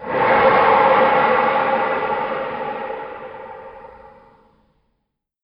Sound effects > Electronic / Design
DSGNWhsh-CU Slow, Low Whoosh Nicholas Judy TDC
A slow, low whoosh design element.
low, slow, whoosh